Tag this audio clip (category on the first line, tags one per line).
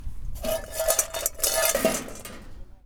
Sound effects > Objects / House appliances
Robot
Metallic
SFX
dumping
garbage
dumpster
Percussion
Junkyard
Smash
rattle
Bang
Perc
rubbish
Atmosphere
trash
Foley
Robotic
Machine
scrape
FX
Bash
Clang
Junk
Clank
Ambience
Environment
Metal
Dump
waste
tube